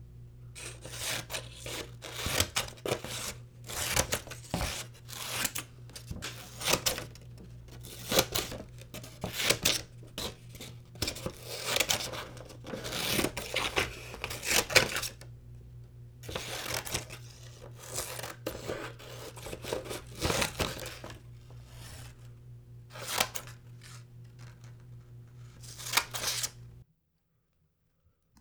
Sound effects > Objects / House appliances
Cutting paper with scissors. Recorded on Zoom H6 and Rode Audio Technica Shotgun Mic.